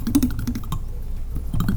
Sound effects > Objects / House appliances
knife and metal beam vibrations clicks dings and sfx-108
Beam, Clang, ding, Foley, FX, Klang, Metal, metallic, Perc, SFX, ting, Trippy, Vibrate, Vibration, Wobble